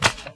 Sound effects > Other
Spike Strike 1
Sounds like a sharp weapon piercing at a surface Made by poking my pen through a hole in a looseleaf Recorded with MAONO AU-A04TC USB Microphone
arrow, duel, hit, impact, impale, needle, pierce, poke, sharp, shovel, spike, stick, strike, thrust, weapon